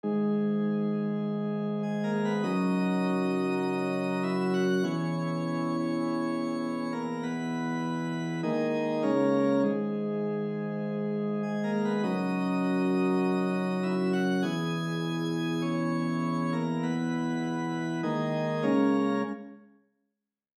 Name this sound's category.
Music > Solo instrument